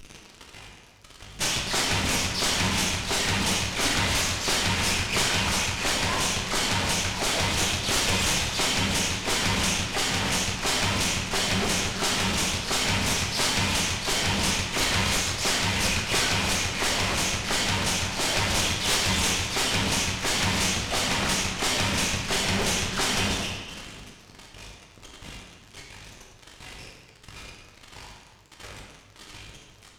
Music > Solo percussion
Simple Bass Drum and Snare Pattern with Weirdness Added 025
Noisy, Experimental-Production, Simple-Drum-Pattern, Interesting-Results, Snare-Drum, Fun, Experimental, FX-Drum, Bass-and-Snare, Bass-Drum, FX-Laden-Simple-Drum-Pattern, Four-Over-Four-Pattern, Experiments-on-Drum-Beats, FX-Drum-Pattern, FX-Laden, Silly, Glitchy, Experiments-on-Drum-Patterns, FX-Drums